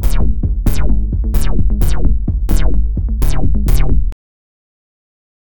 Music > Multiple instruments
Robot Eater
Robotic pulse sound. All original beat, 130bpm. Can be looped if trimmed appropriately.
130bpm, electronic, mechanical-music-machine, music, robotic